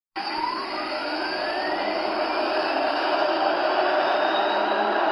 Sound effects > Vehicles
tram accelerating6
Sound of a a tram accelerating from tram stop in Hervanta in December. Captured with the built-in microphone of the OnePlus Nord 4.
field-recording, traffic